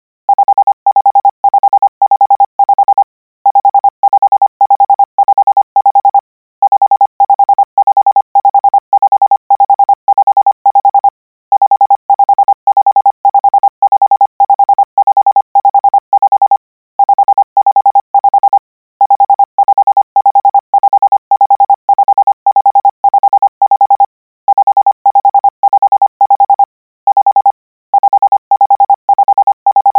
Sound effects > Electronic / Design
Practice hear number '5' use Koch method (practice each letter, symbol, letter separate than combine), 200 word random length, 25 word/minute, 800 Hz, 90% volume.